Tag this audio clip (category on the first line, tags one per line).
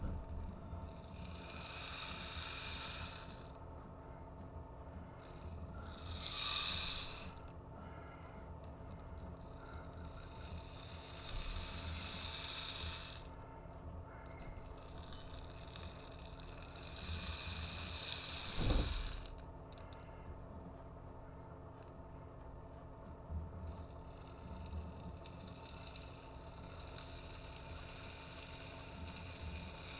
Music > Solo percussion
Blue-brand
Blue-Snowball
rainstick